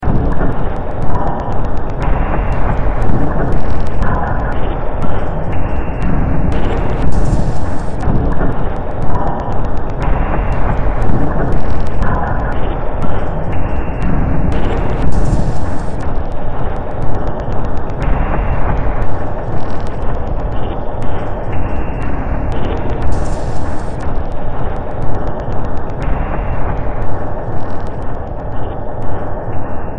Music > Multiple instruments
Demo Track #3762 (Industraumatic)
Ambient, Cyberpunk, Sci-fi, Soundtrack, Underground